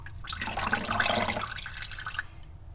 Sound effects > Objects / House appliances
glass beaker small filling with water foley-002
drill, hit, object, fx, metal, natural, percussion, fieldrecording, glass, oneshot, industrial, mechanical, clunk, perc, foundobject, foley, stab, bonk, sfx